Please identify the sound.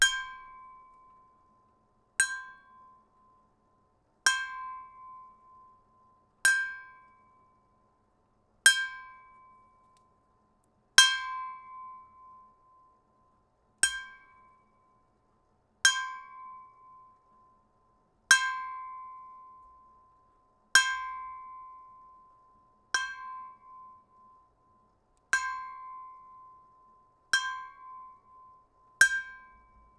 Sound effects > Objects / House appliances
METLImpt-Blue Snowball Microphone, CU 27oz Stainless Steel Water Bottle Nicholas Judy TDC
27oz stainless steel water bottle impacts.
27oz, Blue-brand, Blue-Snowball, bottle, foley, impact, stainless-steel, water